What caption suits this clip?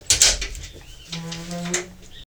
Sound effects > Objects / House appliances
Puerta-abriendo-
Door, Metal, Open